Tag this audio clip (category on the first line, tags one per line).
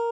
Instrument samples > String
cheap
arpeggio
design
stratocaster
guitar
tone
sound